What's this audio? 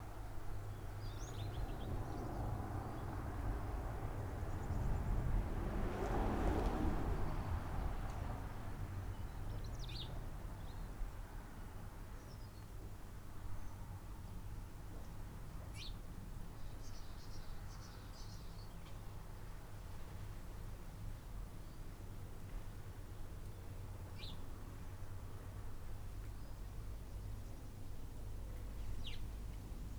Soundscapes > Nature
Sub-Urban Garden Ambience with Birds Singing
Just the ambience of my garden, with the sounds of nature, the occasional vehicle going by and a bit of wind. Recorded with a 1st Generation DJI Mic and Processed with ocenAudio